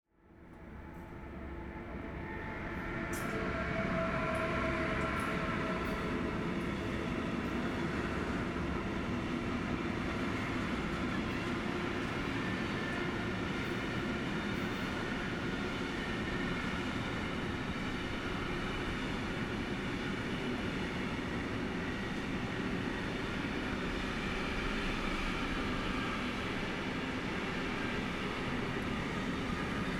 Soundscapes > Urban
Cargo train passing by at a close range. Recorded on Zoom H4n Pro (stock mics)